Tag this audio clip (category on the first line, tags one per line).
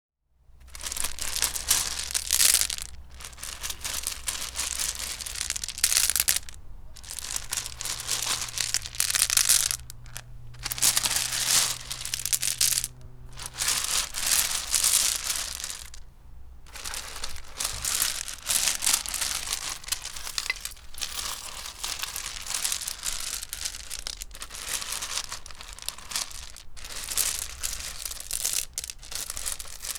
Sound effects > Natural elements and explosions
move
moving
pebble
pebbles
rocks
rough
rubble
rumage
scrape
scraping
stone
stones